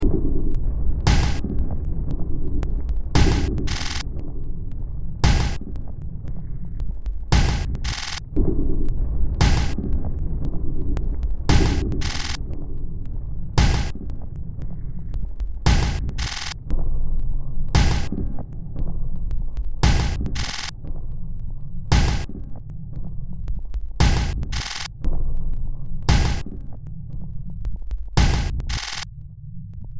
Music > Multiple instruments
Demo Track #4052 (Industraumatic)
Ambient, Noise, Sci-fi, Underground